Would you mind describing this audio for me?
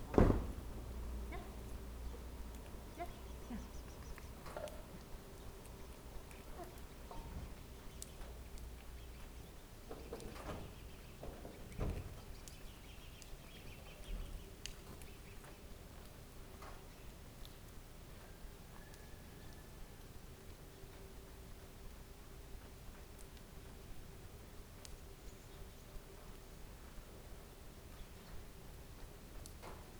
Soundscapes > Urban
2025 08 30 07h23 Gergueil Rue du millieu-D104 - Zoom H2n MS 150
Subject : Recording the church bells. Hoping to get a single hit at 07h30. However the bell only rings at 7am 12:00 and 7pm. Date YMD : 2025 August 30, 07h23. Location : Gergueil 21410 Bourgogne-Franche-Comté Côte-d'Or France. Hardware : Zoom H2n in MS 150° mode. Weather : Processing : Trimmed and normalised in Audacity.
MS-150, field-recording, Gergueil, 21410, Cote-dor, France, MS, country-side, Zoom, H2n, ambience, rural, Tascam